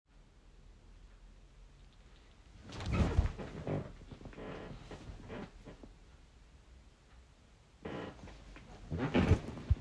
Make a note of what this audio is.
Sound effects > Objects / House appliances
A couple of bedcreak sounds getting into and out of the bed. Could be used as someone is getting into bed or for other purposes, lol. Wanted to give back to this community because of the amount of sounds I used from here.
bed, bedroom, creak, creaking, old, squeak